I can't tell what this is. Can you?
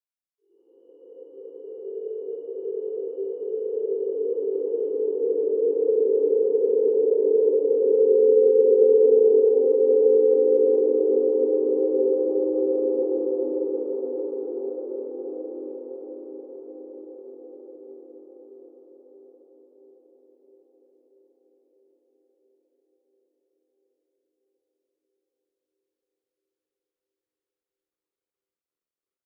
Soundscapes > Synthetic / Artificial
Spooky Ambient Wind
Experimented with the reverberation of the plugin Diva (a mandatory resonance played when in demo mode); includes modulation with granular FX and impulse response.
ethereal, scary